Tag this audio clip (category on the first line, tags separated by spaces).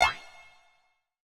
Sound effects > Electronic / Design
BEEP BOOP CHIPPY CIRCUIT COMPUTER DING ELECTRONIC EXPERIMENTAL HARSH HIT INNOVATIVE OBSCURE SHARP SYNTHETIC UNIQUE